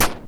Sound effects > Electronic / Design
A gunshot-like sound effect i made from scratch in Audacity.
shoot, shot, weapon, gun, shooting, firing, fire, firearm, gunshot